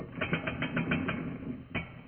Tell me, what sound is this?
Sound effects > Experimental
vg chainlift
Sound of a medeival style chain lift. Slowed down from a saw pulling over, recorded with my phone.